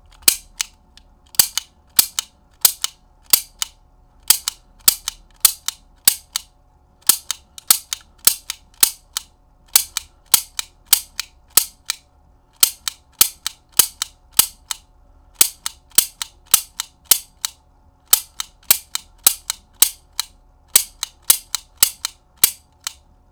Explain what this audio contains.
Sound effects > Objects / House appliances
MECHClik-Blue Snowball Microphone, CU Cap Gun or Generic Nicholas Judy TDC
Cap gun clicks. Also useful for a generic 'click' sound.
click, foley, Blue-brand, generic, Blue-Snowball, gun, cap